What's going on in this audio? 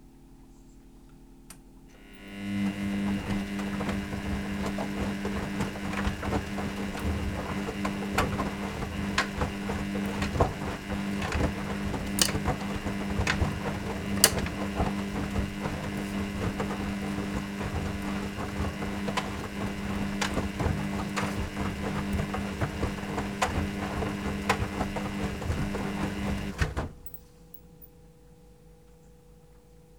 Sound effects > Other mechanisms, engines, machines
Washing machine, close perpective, with a coin inside.